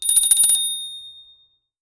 Sound effects > Objects / House appliances
A short, small metal handbell shake.